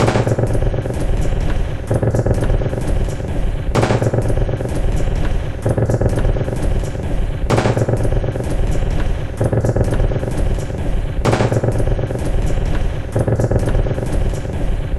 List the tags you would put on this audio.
Instrument samples > Percussion
Ambient Weird Industrial Alien Loopable Dark Underground Packs Soundtrack Samples Drum Loop

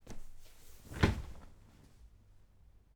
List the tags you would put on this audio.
Sound effects > Objects / House appliances
falling furniture home human movement sofa

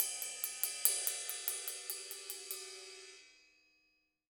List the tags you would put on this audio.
Music > Solo instrument
Crash Custom Cymbal Cymbals Drum Drums FX GONG Hat Kit Metal Oneshot Paiste Perc Percussion Ride Sabian

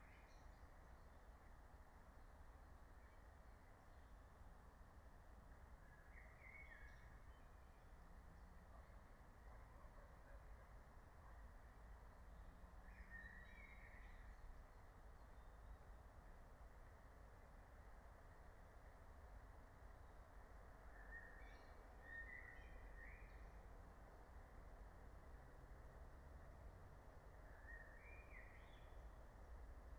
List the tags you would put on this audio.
Soundscapes > Nature
phenological-recording soundscape natural-soundscape alice-holt-forest field-recording meadow

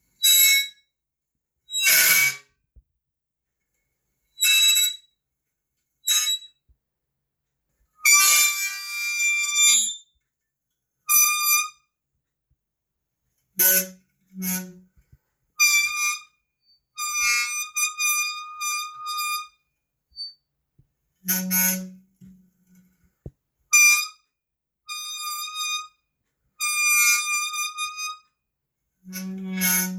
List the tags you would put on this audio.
Sound effects > Other mechanisms, engines, machines
annoying loud metal Phone-recording screech squeal

